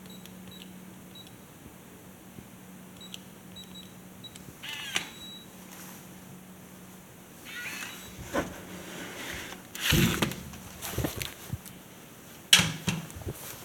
Sound effects > Other mechanisms, engines, machines
Punching a code into a parcel machine, taking out the package and shutting the door. Recorded with my phone.
beep, close, open